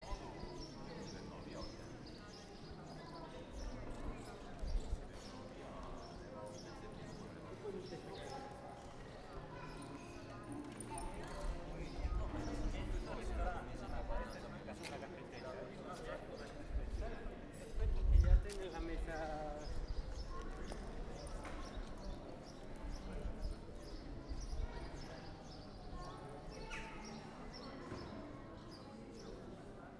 Soundscapes > Urban
Noon on the Piazza del Popolo, Montalcino, Italy
Noon at the Piazza del Popolo in Montalcino, Italy: indistinct conversations and bird sounds; the bells strike noon (12 times); then bells ring for about two minutes. Recorded with Zoom H1.
bells, Montalcino, Italy, Public-square, people, field-recording, birds